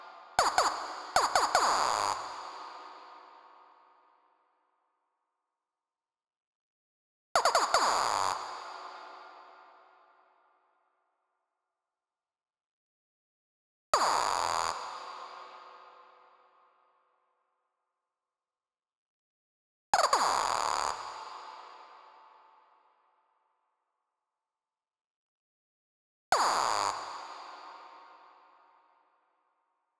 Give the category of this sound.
Sound effects > Electronic / Design